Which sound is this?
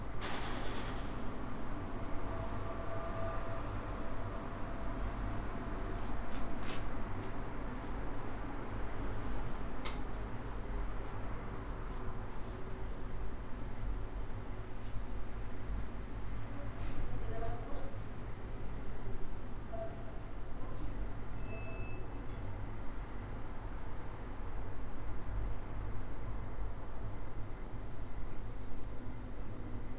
Soundscapes > Urban
Paris ambience through open window 250703
Ambient sound recorded July afternoon 4pm, hot weather, from inside a room on the fourth floor, wide open window onto a side street in Paris. Sounds of traffic, occasional voices, distant siren. Stereo recording with Tascam DR-05X.
street, traffic, city